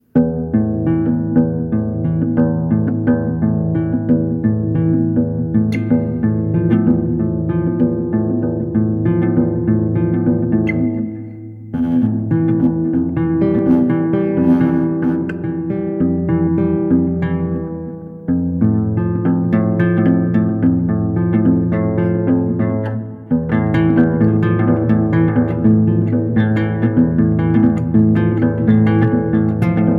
Music > Solo instrument
Guitar One
Strings, lonely, Guitar, Sad, Nylon